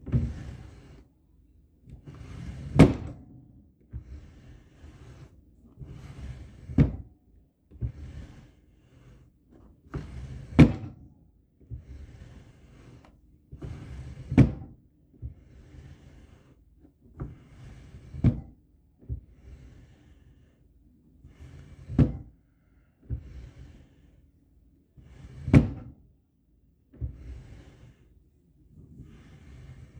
Sound effects > Objects / House appliances
DRWRWood-Samsung Galaxy Smartphone Drawer, Slide Open, Close Nicholas Judy TDC
A wooden drawer sliding open and closed.
open, wooden, Phone-recording, slide, drawer, close